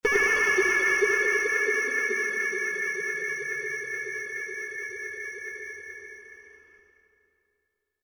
Sound effects > Other
Weird laugh
Made in jummbox
Creepy, Laugh, Weird